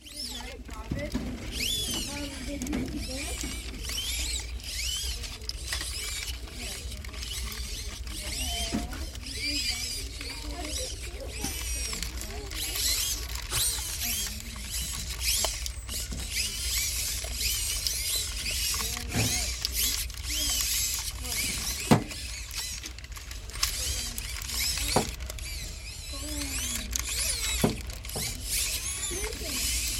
Sound effects > Other mechanisms, engines, machines
ROBTMvmt-Samsung Galaxy Smartphone, CU Robot Dog Movements Nicholas Judy TDC
Robot dog movements. Recorded at Goodwill. Some faint children's voices in background.
Phone-recording, dog, movement, robot